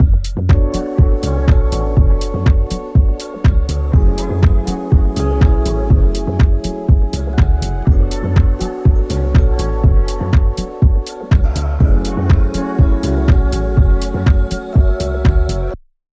Music > Multiple instruments
RUSOWSKI FULL
cool beat inspired in rusowski style of music. Part of a whole beat. AI generated: (Suno v4) with the following prompt: generate a funky and fun instrumental inspired in the spanish artist rusowski or something similar, with vocal chops and a nice bass, in F# minor, at 90 bpm.
bass, funky, ai-generated, synth